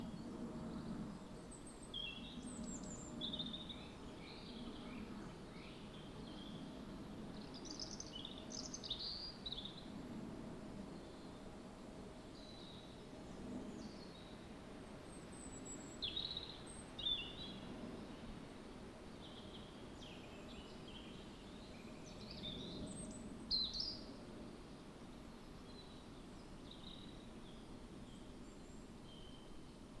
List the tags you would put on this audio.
Soundscapes > Nature
weather-data
Dendrophone
sound-installation
data-to-sound
natural-soundscape
phenological-recording
nature
alice-holt-forest
field-recording
raspberry-pi
modified-soundscape
artistic-intervention
soundscape

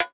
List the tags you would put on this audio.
Instrument samples > Percussion
1lovewav
analog
drum
drum-kit
drum-sounds
fat
kit
metal-perc
Natural
percussion

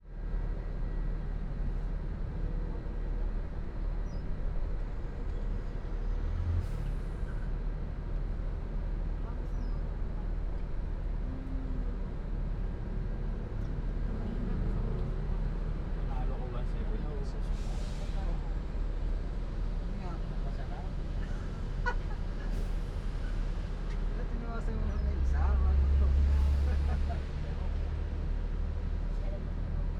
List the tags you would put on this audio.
Sound effects > Vehicles
ambience
atmosphere
bus
close
doors
engine
field-recording
horn
motor
noise
open
people
Philippines
road
slow
soundscape
transportation
travel
trip
vehicle
voices

